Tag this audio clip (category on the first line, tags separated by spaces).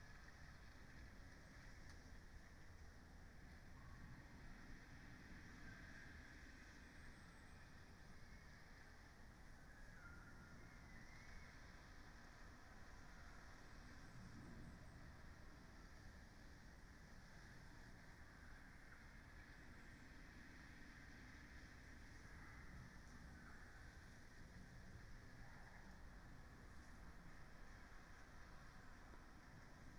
Soundscapes > Nature
artistic-intervention Dendrophone field-recording modified-soundscape natural-soundscape nature raspberry-pi soundscape weather-data